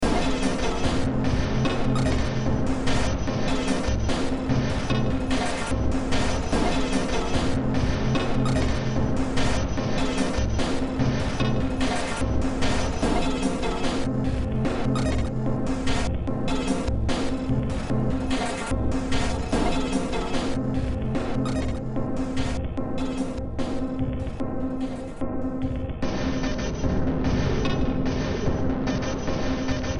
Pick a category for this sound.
Music > Multiple instruments